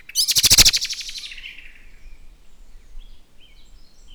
Soundscapes > Nature
Bird-Flyby XY
Subject : XY recording of a bird passing by, Taken out of a generally long recording also available here. Date YMD : 2025 04 18 13h30 Location : Gergueil France. Hardware : Zoom H5 with stock XY capsule Weather : cloudy with a bit of clear. Processing : Trimmed and Normalized in Audacity. Probably some fade in/out.